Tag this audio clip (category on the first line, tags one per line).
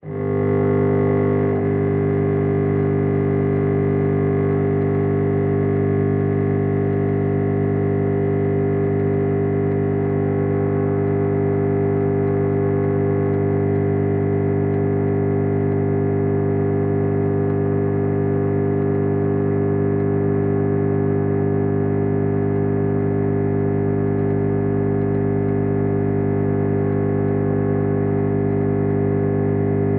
Sound effects > Objects / House appliances
appliances
deep
home
household
indoor
low